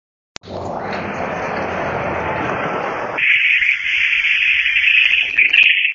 Soundscapes > Urban
Bus passing by 17
Where: Hervanta Keskus What: Sound of a bus passing by Where: At a bus stop in the evening in a cold and calm weather Method: Iphone 15 pro max voice recorder Purpose: Binary classification of sounds in an audio clip
bus, bus-stop, traffic